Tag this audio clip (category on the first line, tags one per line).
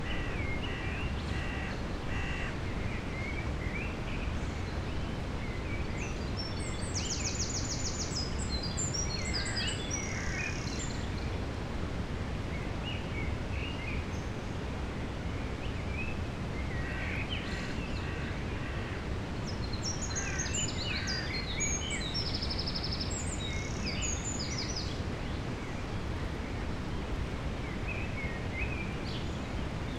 Sound effects > Animals
chirp
France
brid
2025
Saturday
Mono
Albi
NT5
81000